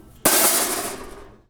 Sound effects > Objects / House appliances
Junkyard Foley and FX Percs (Metal, Clanks, Scrapes, Bangs, Scrap, and Machines) 21
Junkyard, Percussion, Junk, Environment, Clang, rubbish, Ambience, garbage, waste, FX, trash, Bash, Clank, Robotic, tube, SFX, dumpster, Foley, Metal, Smash, Metallic, scrape, rattle, Atmosphere, Perc, Robot, dumping, Dump, Bang, Machine